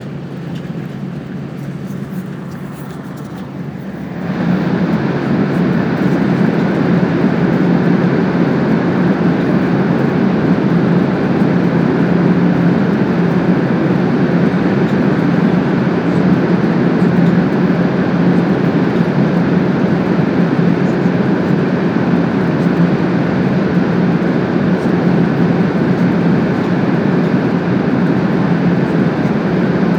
Sound effects > Vehicles
Spanish High Speed Train (AVE) running at 300 km/h (186,41 mph) entering and exiting a couple of tunnels on a trip between Madrid and Valencia. Recorded with the Tascam PCM Recorder app on an Iphone 5, no further processing. Geolocation is aprox.